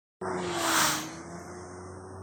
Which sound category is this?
Sound effects > Vehicles